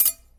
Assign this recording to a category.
Sound effects > Objects / House appliances